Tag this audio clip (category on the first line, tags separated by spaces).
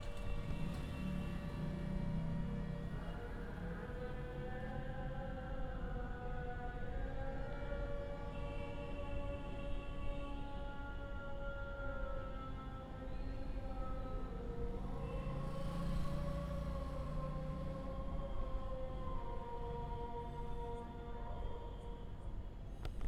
Soundscapes > Urban
Auto singer